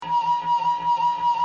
Sound effects > Other
1 SECOND GUITAR FEEDBACK
1 second of Guitar feedback when the Record button was accidentally pushed & saved as a sample. Ibanez JEM thru main console monitors captured on Tascam 24 track.
high-pitch, Guitar